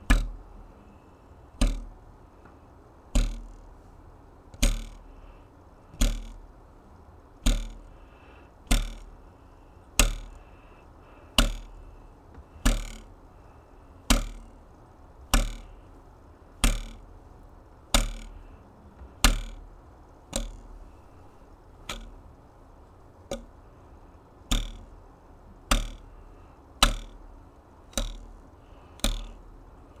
Sound effects > Objects / House appliances
Credit card twangs.